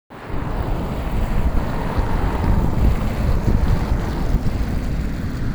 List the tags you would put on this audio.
Sound effects > Vehicles
car traffic vehicle

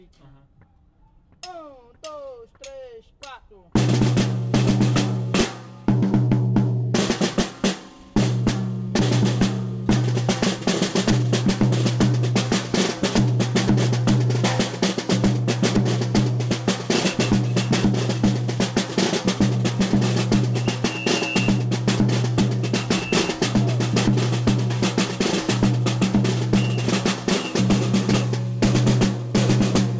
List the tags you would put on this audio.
Soundscapes > Urban
a Brazilian Brilhante Caixa class do Estrela Maracatu Nao Pitoco Recife Snare terrace

